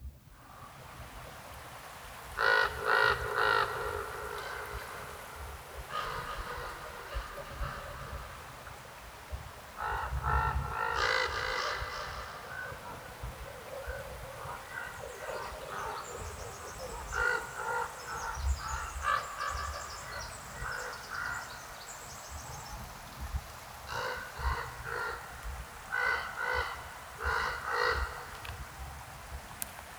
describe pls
Soundscapes > Nature
Redwood Ravens in Canyon with Echos, Wing Flaps, and Rushing water
Ravens and other birds in the Redwoods forest of Humboldt California, recorded with a Tascam field recorder, lightly processed in Reaper using Fab Filter, Izotope RX
serene, redwoods, raven, canyon, natural, birdsong, birds, ambience, calming, ravens, water, field-recording, animals, animal, landscape, beautiful, trees, calm, echo, nature, spring, stream, ambiance, ambient, forest, tree